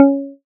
Instrument samples > Synths / Electronic
APLUCK 2 Db
additive-synthesis, fm-synthesis, pluck